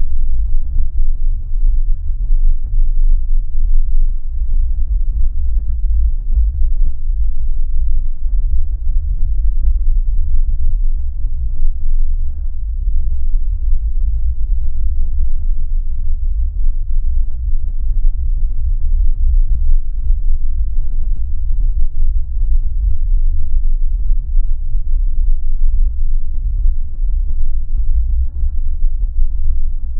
Sound effects > Experimental
Space Flight 8
"Refugees aboard the light star cruiser held each other close as the battle waged outside." For this sound, I recorded ambient noise from my apartment. Then used Audacity to produce the final piece.
heavy, impacts, action, thumping, intense